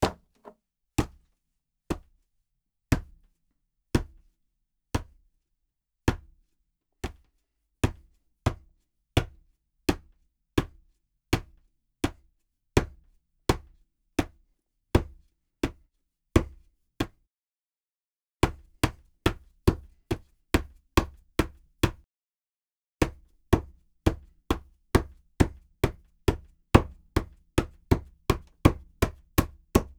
Sound effects > Other
Banging against wall - hitting
Sounds of banging against wall surfaces. We're seeking contributors!
Banging, hitting, NSFW, pounding, WALL